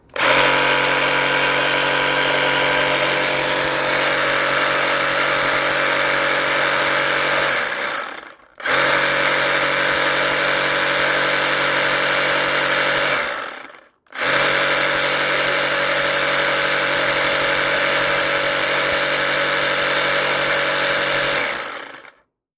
Sound effects > Objects / House appliances
TOOLPowr-Samsung Galaxy Smartphone, CU Hackzall, Start, Run, Stop, X3 Nicholas Judy TDC
A hackzall starting, running and stopping three times.
hackzall, Phone-recording, run, start, stop